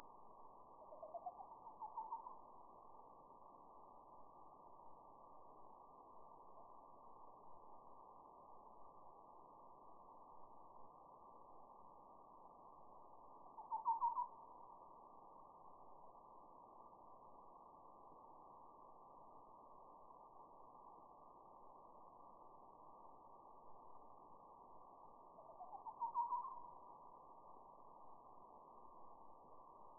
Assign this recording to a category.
Sound effects > Animals